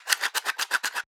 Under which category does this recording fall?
Sound effects > Objects / House appliances